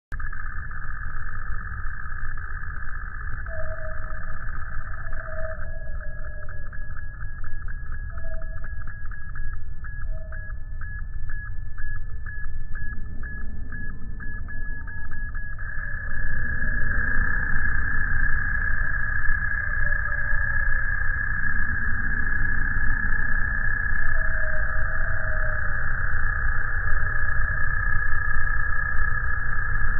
Sound effects > Electronic / Design
Starlink "Dishy" Satellite Transceiver Recorded at 240FPS, then played back at the slowed down speed.
This is what it produced! Enjoy. NOTE: There are occasional 'howls' from the birds present in the sound clip as well, interesting how different animals and insects sound when slowed down, especially.. CROWS!
beep, beeping, computer, data, digital, electronic, glitch, harsh, modem, noise, noise-modulation, sattellite, Sci-Fi, technology